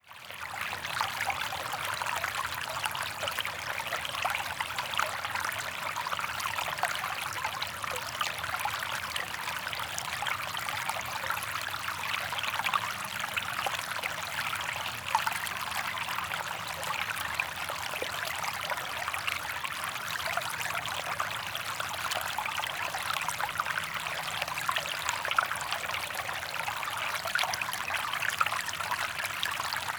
Soundscapes > Nature
flood stream 2 - black river - 01.16.26
The sound of a stream formed from the river flowing past its bank.
river, stream, water